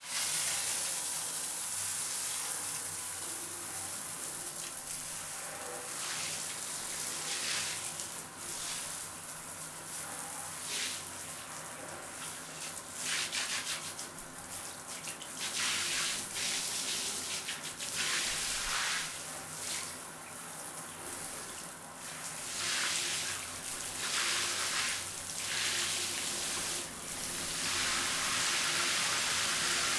Sound effects > Objects / House appliances
Recorded that sound by myself with Recorder - H1 Essential